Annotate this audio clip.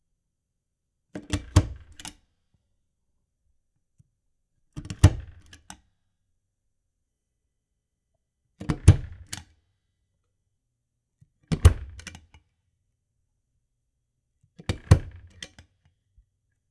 Sound effects > Objects / House appliances
Stapler On Paper
Stapling some paper. Enjoy. Recorded on Zoom H6 and Rode Audio Technica Shotgun Mic.
mechanical, office, staple